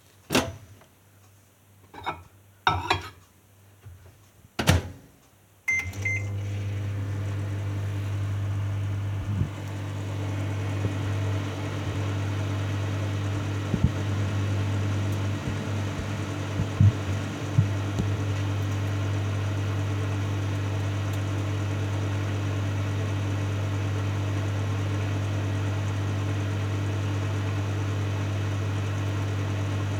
Sound effects > Objects / House appliances
Microwave turning on and running. Recorded with my phone.